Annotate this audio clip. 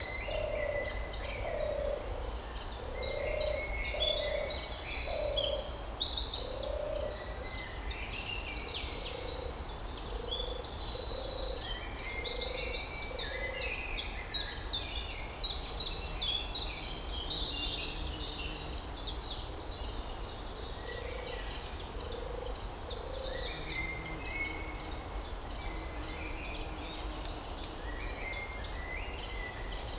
Soundscapes > Nature
Two Turtle Doves (Bedgebury Forest)
📍 Bedgebury Pinetum & Forest, England 12.05.2025 9.45am Recorded using a pair of DPA 4060s on Zoom F6
birds, birdsong, field-recording, forest, nature, spring, turtle-doves